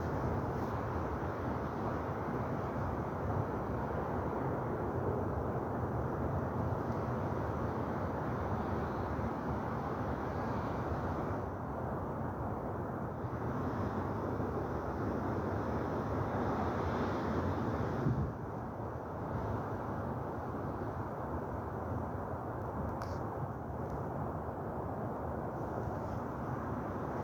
Sound effects > Natural elements and explosions
Winchester Wind ambience
Ambient recording of light wind in the wires with very distant traffic. Recorded on a night hike on a hillside just outside of Winchester, UK on a Samsung Galaxy Phone. It was a windy evening with light rain and the wind was just humming in the electricity wires above.
atmosphere, field-recording, traffic